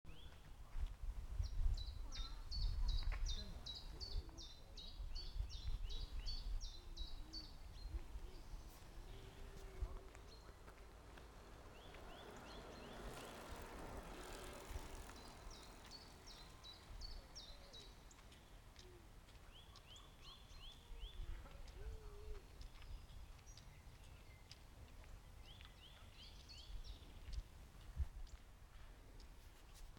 Soundscapes > Nature
German Woods Spring
Birds singing on the Mauerweg, Berlin, Germany. Recorded with a phone.